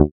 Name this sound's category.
Instrument samples > Synths / Electronic